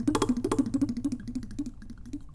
Sound effects > Objects / House appliances
knife and metal beam vibrations clicks dings and sfx-109
Metal, metallic, Perc